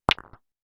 Sound effects > Other mechanisms, engines, machines
Lawn Bowl-Single-Contact-03-kate
This final sound was meant to represent "The Kitty" being hit hard. The Kitty is also known as the Jack. Apparently on that day I remembered the generic name of the small white ball as Kate. So Kate = Kitty OR Kate = Jack Though I am sure I have heard "her" called that by players on the field.
Bowls, Contact